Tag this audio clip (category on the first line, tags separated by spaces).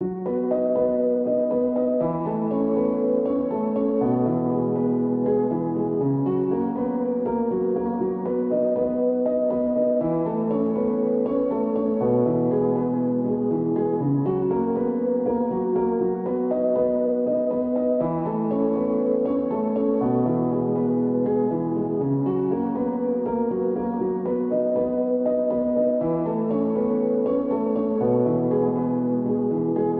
Music > Solo instrument
pianomusic simple loop samples 120bpm reverb piano simplesamples 120 free music